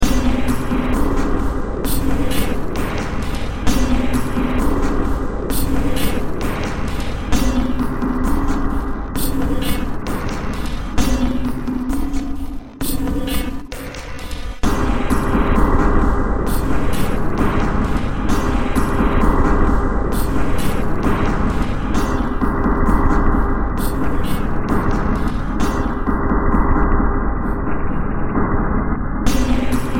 Music > Multiple instruments
Short Track #3297 (Industraumatic)
Ambient, Cyberpunk, Games, Horror, Industrial, Noise, Sci-fi, Soundtrack, Underground